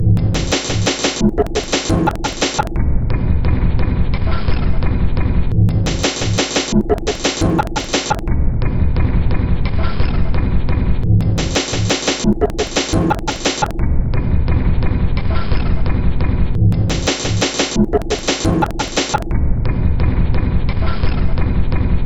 Instrument samples > Percussion
This 87bpm Drum Loop is good for composing Industrial/Electronic/Ambient songs or using as soundtrack to a sci-fi/suspense/horror indie game or short film.
Ambient, Drum, Loop, Loopable, Packs, Samples, Soundtrack, Underground, Weird